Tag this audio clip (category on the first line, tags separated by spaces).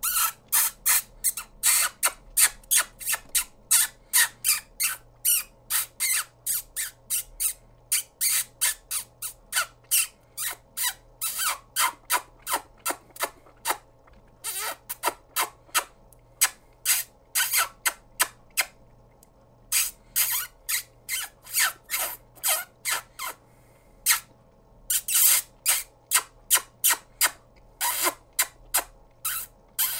Sound effects > Animals
bat Blue-brand Blue-Snowball human imitation monkey mouse rat squeak